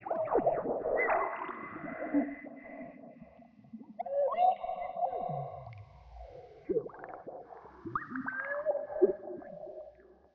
Soundscapes > Synthetic / Artificial
LFO Birdsong 71
LFO, massive, Birdsong